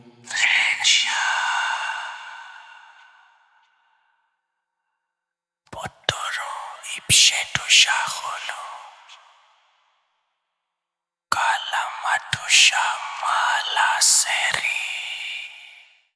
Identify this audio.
Speech > Other
cult whispers
Occult whispering.. Not a real language, Just sounds nice and mysterious
whispering, spell, occult